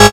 Instrument samples > Synths / Electronic
DRILLBASS 8 Ab
bass, fm-synthesis, additive-synthesis